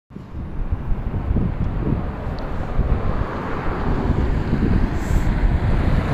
Sound effects > Vehicles
Outdoor recording of a passing car on Malminkaari Road in Helsinki. Captured with a OnePlus 8 Pro using the built‑in microphone.
car, traffic, vehicle